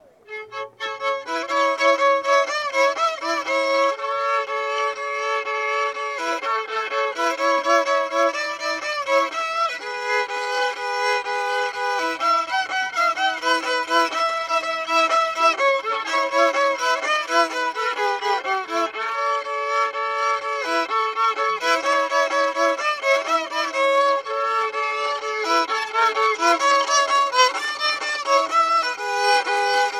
Instrument samples > String
Violinista comunidad Chorti Copan Ruinas Honduras
Violinist of the Chorti community recorded in Copan Ruinas, Honduras. It is known as the 'Paris of the Mayan World' due to the exquisite artistry of its stelae and hieroglyphic stairway, which narrate the dynasty of Copán's rulers.